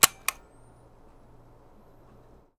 Sound effects > Objects / House appliances
COMCam-Blue Snowball Microphone, CU Nickelodeon Photo Blaster, Flash, Electronic Whine Nicholas Judy TDC
A Nickelodeon Photo Blaster camera flash and electronic whine.
camera, Blue-brand, whine, flash, Blue-Snowball, electronic